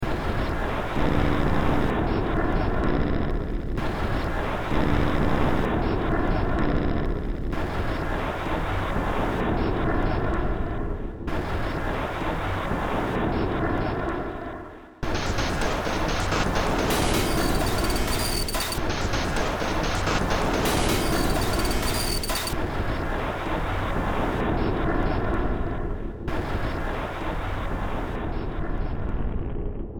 Music > Multiple instruments
Demo Track #3480 (Industraumatic)
Ambient, Cyberpunk, Games, Horror, Industrial, Noise, Sci-fi, Soundtrack, Underground